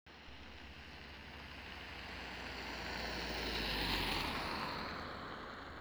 Sound effects > Vehicles
tampere car18
vehicle, automobile